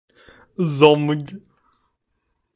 Speech > Solo speech
I exclaim "ZOMG!".